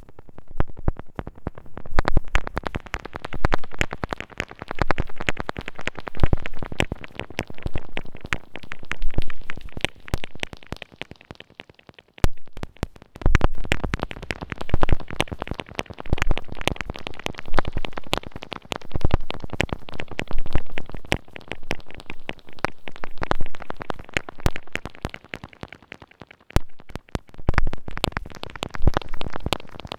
Sound effects > Electronic / Design
Dustmite Chorale 12
The 'Dustmites Chorale' pack from my 'Symbiotes' sampler is based on sounds in which the dominant feature is some form of surface noise, digital glitch, or tape hiss - so, taking those elements we try to remove from studio recordings as our starting point. This excerpt is based on the distinct popping and panning of pulsar wavelets, with some mild delay effect added. Thanks to Hieroglyphic Plume eurorack module for the realization of these sounds.
noise, dust, pulsar-synthesis, tactile, delay, crackle, surface